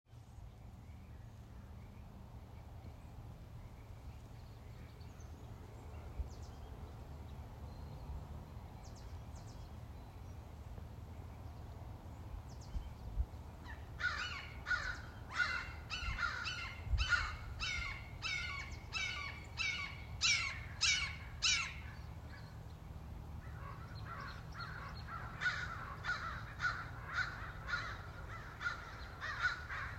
Soundscapes > Nature
Red shoulder hawks 02/27/2024
Sound of red shoulder hawks